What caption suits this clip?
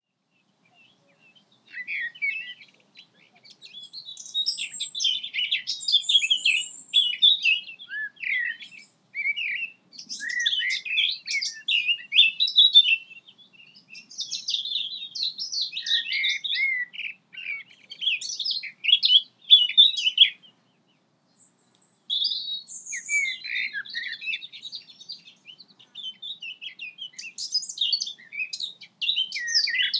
Soundscapes > Nature
An recording from RSPB Langford Lowfields. Edited using RX11.